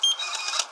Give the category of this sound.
Sound effects > Other mechanisms, engines, machines